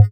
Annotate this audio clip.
Instrument samples > Synths / Electronic
BUZZBASS 8 Ab
fm-synthesis, bass, additive-synthesis